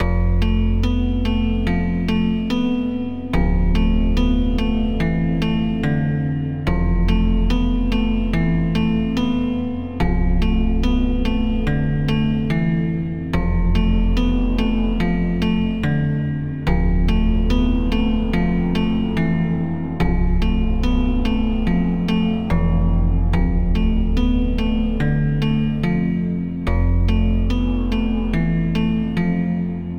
Music > Multiple instruments
Until Midnight [Looping Tune]
A dark, atmospheric piano-driven ambient piece built for tension, loneliness, and slow psychological unraveling. Inspired by Silent Hill-style textures and noir-drenched story scoring. 🎵 BPM: 72 🎬 Length: 0:53 🗝️ Key / Feel: F minor • Brooding • Distant • Cinematic 🧰 Tools: Features soft Rhodes-like arpeggios, deep tonal pads, subtle wind ambience, and an evolving harmonic drone designed for looping beneath narration or game environments. Add the credit in your video description, game credits, or project page.
ambience, ambient, atmosphere, atmospheric, bed, cinematic, creepy, dark, dreamlike, emotional, haunting, horror, instrumental, loop, minimal, moody, music, soundtrack, spooky, tension, theme